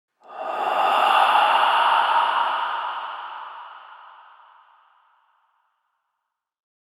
Sound effects > Electronic / Design
Ableton Live. VST. Abstract Vox......Sound breath Free Music Slap House Dance EDM Loop Electro Clap Drums Kick Drum Snare Bass Dance Club Psytrance Drumroll Trance Sample .
Sound, vst, samples, ambiance, effect, sampling, breath